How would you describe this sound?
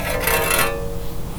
Sound effects > Other mechanisms, engines, machines
Handsaw Tooth Teeth Metal Foley 1
vibration, foley, tool, fx, sfx, shop, saw